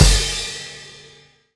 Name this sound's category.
Instrument samples > Percussion